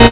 Instrument samples > Synths / Electronic
Synthesized instrument samples